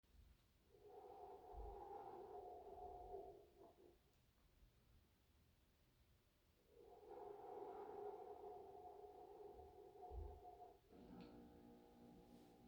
Sound effects > Human sounds and actions
Whistling wind
You likely will need to turn the volume up by 12 decibels to hear it. The soft whistle happens twice, produced by mouth. It can be used for wind, flying, subtle effects and more.
blow, blowing, howl, soft, windy